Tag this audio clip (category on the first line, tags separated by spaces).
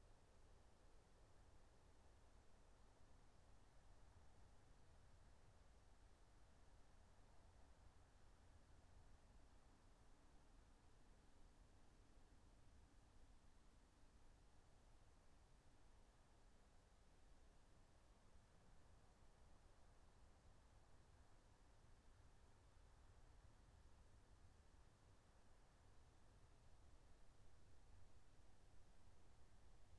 Soundscapes > Nature
alice-holt-forest field-recording meadow natural-soundscape nature phenological-recording raspberry-pi soundscape